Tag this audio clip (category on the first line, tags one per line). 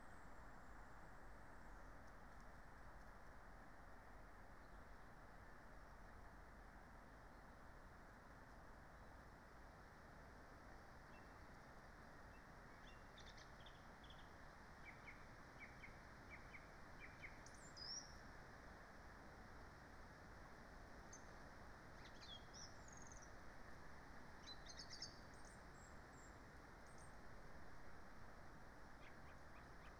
Soundscapes > Nature
weather-data
nature
artistic-intervention
Dendrophone
raspberry-pi
modified-soundscape
field-recording
sound-installation
soundscape
data-to-sound
alice-holt-forest
phenological-recording
natural-soundscape